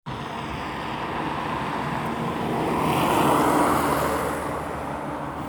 Soundscapes > Urban
What: Car passing by sound Where: in Hervanta, Tampere on a cloudy day Recording device: samsung s24 ultra Purpose: School project